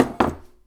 Sound effects > Other mechanisms, engines, machines
metal shop foley -024
little, perc, bam, knock, metal, rustle, fx, pop, thud, sfx, bang, wood, tools, strike, oneshot, crackle